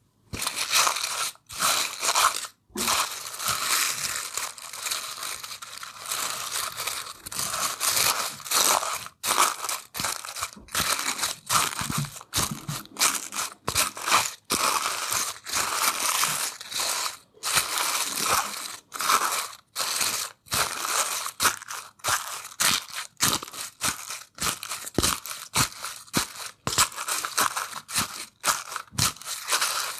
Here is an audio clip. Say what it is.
Sound effects > Natural elements and explosions
Crunchy or Crushed Leaves
Made by adding dried rose petals to a bowl, and a rock to crush said rose petals Edited in Audacity: Trimmed unnecessary sections, volume normalization and noise reduction for redundant bg sounds.
crunch, crush, leaf, leaves, steps, walk